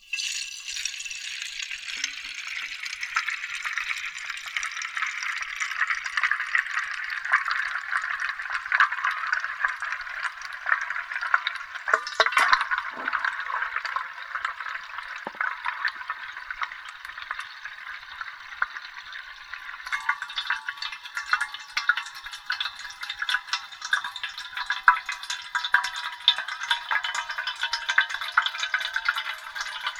Sound effects > Experimental
Water slowly filling a giant thermos with some hitting the side of it to create this ringing sound recorded with a contact microphone.